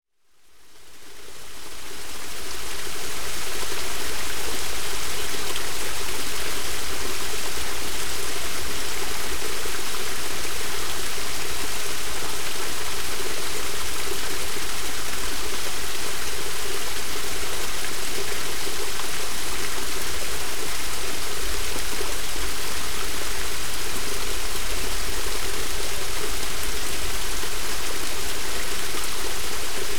Soundscapes > Nature
A recording of water passing through a culvert onto small rocks. Tascam. Stereo.
field-recording, rocks, water